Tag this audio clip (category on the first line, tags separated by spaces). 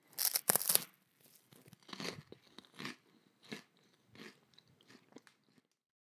Sound effects > Other
bag bite bites corn crunch crunchy design effects foley food handling plastic postproduction puffed recording rustle SFX snack sound texture